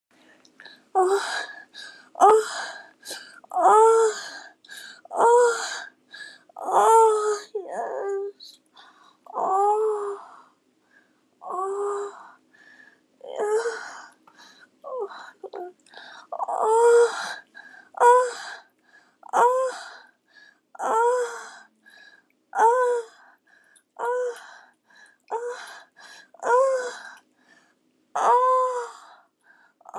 Sound effects > Human sounds and actions
Fast sex recorded by my wife. Had a lot of fun!